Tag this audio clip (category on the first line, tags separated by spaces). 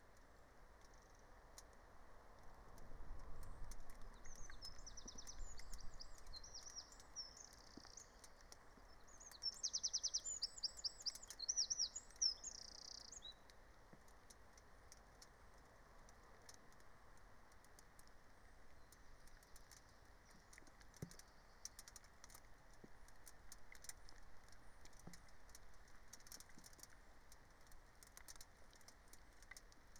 Soundscapes > Nature
phenological-recording soundscape